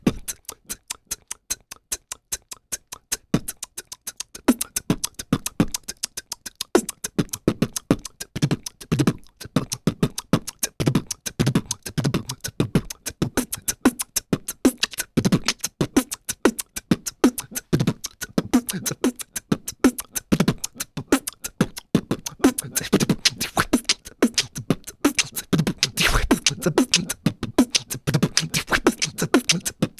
Music > Solo percussion
Beatbox Freestyle Session 5
Unprocessed, unedited beatbox freestyle session
beatbox, percussion, human-beatbox, beat, beat-box